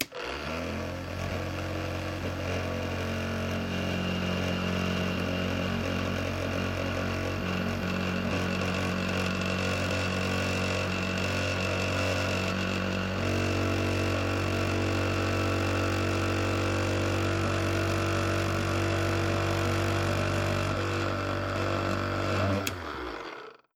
Sound effects > Objects / House appliances
MACHAppl-Samsung Galaxy Smartphone, CU Bodum Bistro Electric Milk Frother, Start, Run, Stop Nicholas Judy TDC
A Bodum bistro electric milk frother starting, running and stopping.
electric, milk-frother, Phone-recording, run, start, stop